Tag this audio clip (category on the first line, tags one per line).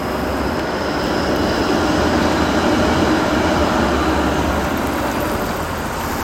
Soundscapes > Urban
tram
tramway
transportation
vehicle